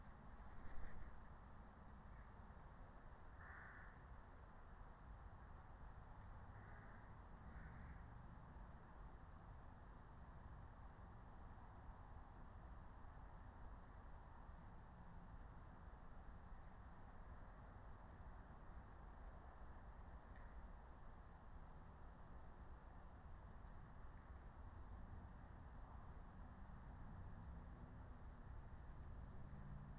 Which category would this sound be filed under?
Soundscapes > Nature